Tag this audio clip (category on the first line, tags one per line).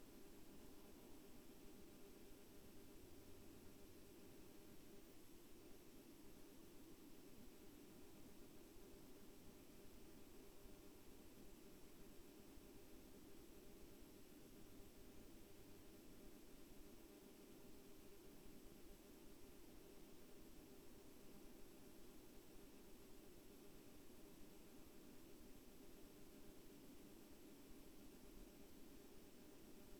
Soundscapes > Nature
sound-installation
natural-soundscape
soundscape
nature
weather-data
modified-soundscape
alice-holt-forest
raspberry-pi
data-to-sound
phenological-recording
field-recording
Dendrophone
artistic-intervention